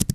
Sound effects > Objects / House appliances
Subject : A keypress from a Macbook Air M2 Keyboard. Date YMD : 2025 03 29 Location : Saint-Assiscle, South of France. Hardware : Zoom H2N, MS mode. Weather : Processing : Trimmed and Normalized in Audacity.